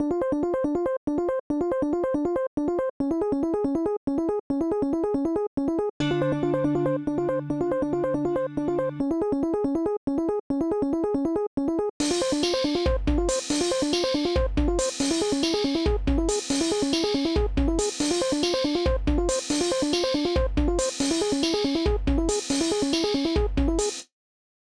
Music > Other
Tense encounter
Created for an event in my RPG Maker game.
arpeggio, synth